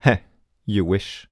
Speech > Solo speech
Cocky - Heh you wish
singletake, Man, sentence, Human, Tascam, voice, cocky, oneshot, U67, Voice-acting, dialogue, Male, smug, Mid-20s, Neumann, words, NPC, FR-AV2, Video-game, talk, sarcastic, Single-take, Vocal